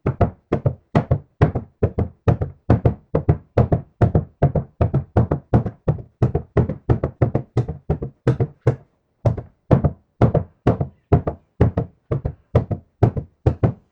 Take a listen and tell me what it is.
Sound effects > Animals
lolloping charging beast
Sound of a heavy animal / beast / monster charging. Foley, using two heavy books. Audio cleaned to remove hiss.
charging, running, lolloping, animal, monster, charge